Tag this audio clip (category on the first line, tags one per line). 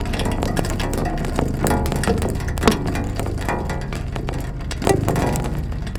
Soundscapes > Nature
electric
rain
longsample
raindrops
storm